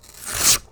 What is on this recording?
Sound effects > Objects / House appliances
PAPRRip-Blue Snowball Microphone, CU Paper 02 Nicholas Judy TDC
A paper rip.
Blue-brand, foley, paper, Blue-Snowball, rip